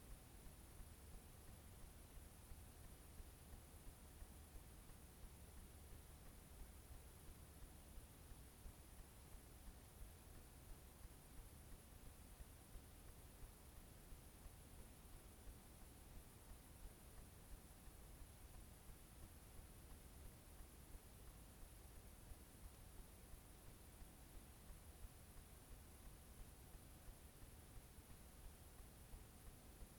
Soundscapes > Nature
2025 09 07 20h40 Gergueil in combe de poisot - Vorest valley ambience
Subject : Ambience recording of the forest. Inside a "combe" (bottom of valley) forest. Microphone was under a bolder/rock with a tree ontop a few meters from the path. Date YMD : 2025 September 08, 05h00 Location : Gergueil 21410 Bourgogne-Franche-Comté Côte-d'Or France. Hardware : Zoom H2n XY mode. Small rig magic arm. Weather : Rain. Processing : Trimmed and normalised in Audacity. Zoom was set at 6gain, added a little in post. Notes : Nothing of significance, I don't hear many animals sadly. There is a thunderstorm and heavy rain in the recording.
21410, ambiance, ambience, combe, Cote-dor, country-side, downpour, field-recording, forest, France, Gergueil, H2n, nature, night, rain, rural, Tascam, valley, XY, Zoom